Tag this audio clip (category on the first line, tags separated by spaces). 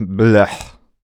Speech > Solo speech
disgusted displeasured gross grosse Man Neumann Single-take Tascam U67 Video-game Voice-acting